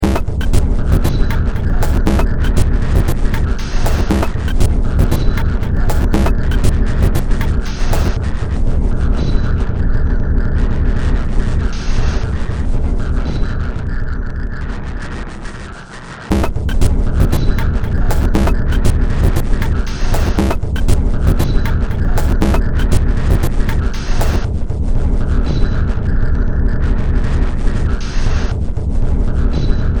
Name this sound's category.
Music > Multiple instruments